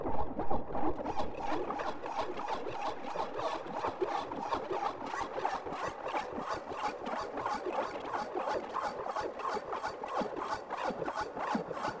Sound effects > Electronic / Design
Felching Fish
commons, creative, free, industrial-noise, noise, royalty, sci-fi, scifi, sound-design